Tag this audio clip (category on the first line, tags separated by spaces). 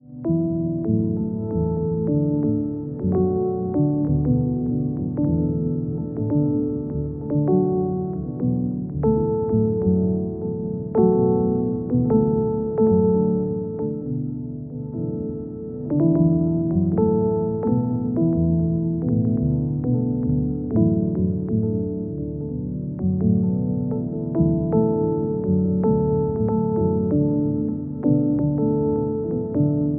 Soundscapes > Synthetic / Artificial
Ambient Granular Atomosphere Beautiful Botanica